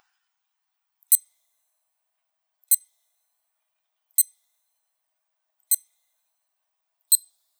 Sound effects > Objects / House appliances
My watch beeps as it scrolls through different modes and settings. It could be used for any vague electronic beep sound. There are two pitches. Recorded on Zoom H6 and Rode Audio Technica Shotgun Mic.